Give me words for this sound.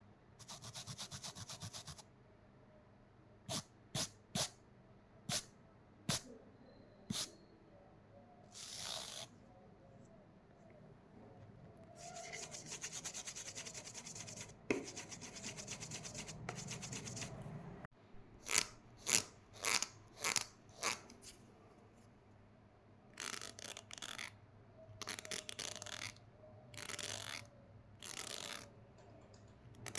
Soundscapes > Indoors
Clean scratch surface
just used sound of the teeth of the comb.